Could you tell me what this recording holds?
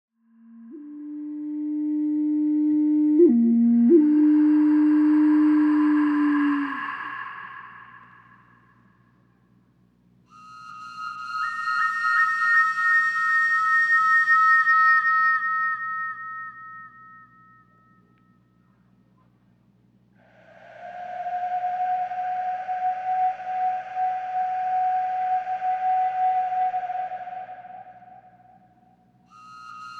Music > Multiple instruments
Pieza realizada para el documental "Polvo en el tiempo". Sistema Michoacano de Radio y Television. Piece created for the documentary "Dust in Time." Michoacan Radio and Television System.